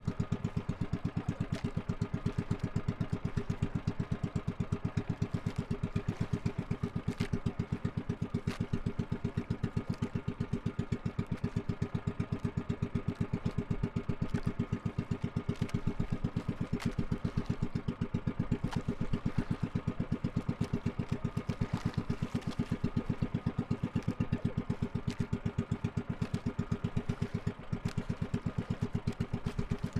Sound effects > Vehicles
250814 174347 PH Bangka
Bangka (take 1). In the Philippines, Bangka is a small outrigger boat used by people for fishing Here, I’m travelling in one of these small boats with a young cute girl (7 years old) who’s sitting in front of me and laughing some times when the waves splash on the boat, while her father is driving on my back. Please note that I pointed the recorder to the right outrigger of the bangka, so that one can hear the water on it, while the little girl on the front faces to the left microphone, and her father and the motor of the boat face to the right mic. At #1:04, one can hear a bigger boat passing by near us. Recorded in August 2025 with a Zoom H5studio (built-in XY microphones). Fade in/out applied in Audacity.
engine, field-recording, fun, girl, motor, Philippines, sea, soundscape, Tingloy, voice